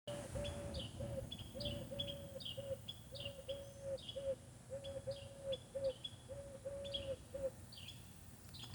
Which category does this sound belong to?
Sound effects > Animals